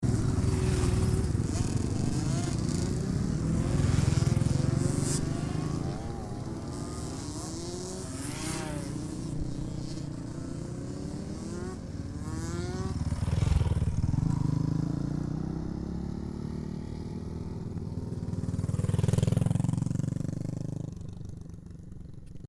Soundscapes > Other
Supermoto Polish Championship - May 2025 - vol.7 - Racing Circuit "Slomczyn"
Recorded on TASCAM - DR-05X; Field recording on the Slomczyn racetrack near Warsaw, PL; Supermoto Championship. I got closed for this recording, near the racetrack entrance to take the sound in, you can hear it moving right to left.
moto, engine, warszawa, tor, supermoto, motocross, smolczyn, motor, motorbike, racetrack, race, motorcycle